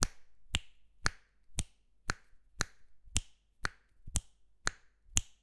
Sound effects > Human sounds and actions
Finger snaps #002
Series of finger snaps Recorded with Tascam Portacapture X6
snap, fingersnap, Finger